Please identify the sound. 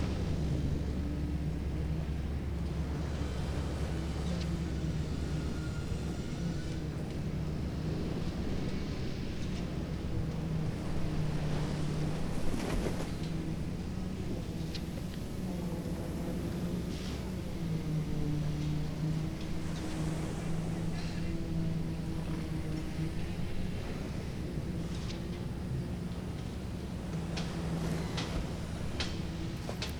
Soundscapes > Urban
AMBSea-Summer Condo Construction on the Beach, Waves, Seagulls, 730AM QCF Gulf Shores Alabama Zoom H3VR
Nearby Construction Site at the Beach. A large condo being built alongside the public beach in Gulf Shores, Alabama, 7:00 AM. Surf, waves, wind, construction